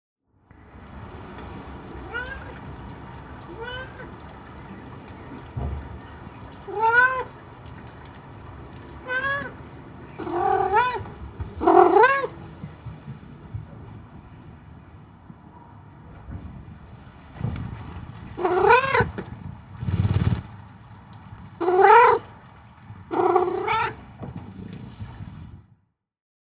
Sound effects > Animals
You can hear a Norwegian Forest Cat making her way home through the garden. She pauses to greet the garden with a soft meow, gives her fur a good shake, and meows once more.
animal, animals, cat, creature, greeting, growl, hello, meow, moan, outdoor, pet, purr